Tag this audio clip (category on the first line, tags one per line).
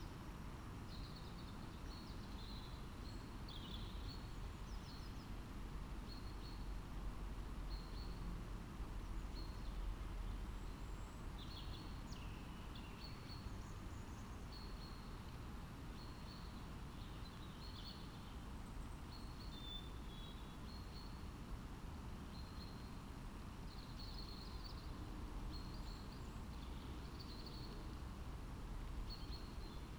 Soundscapes > Nature
soundscape
alice-holt-forest
phenological-recording
sound-installation
modified-soundscape
field-recording
nature
artistic-intervention
natural-soundscape
data-to-sound
raspberry-pi
weather-data
Dendrophone